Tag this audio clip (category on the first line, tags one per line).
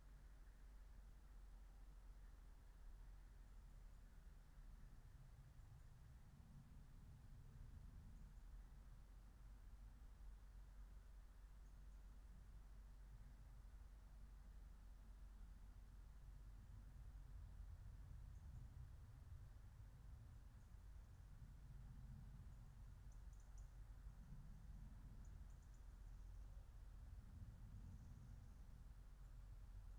Soundscapes > Nature
alice-holt-forest
phenological-recording